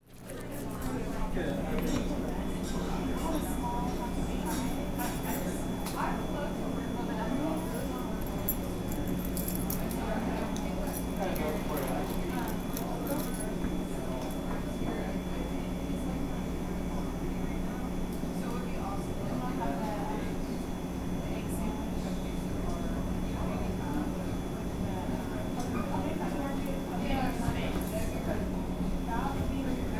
Soundscapes > Urban
Coffee Shop Ambience , Northtown Coffee, Arcata, CA
Field recording of a cafe in Arcata California. Getting Coffee in line at Northtown Coffee, with all the hubbub of humans and espresso machines. footsteps, talking, chattering, clinks, clanks, air, and coffee being poured. Recorded with a Tascam DR-05 and lightly processed in Reaper. Enjoy~
fx, general-noise